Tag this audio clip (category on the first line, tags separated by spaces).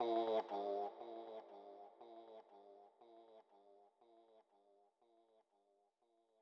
Speech > Other
scream shriek yell